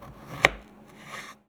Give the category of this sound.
Sound effects > Human sounds and actions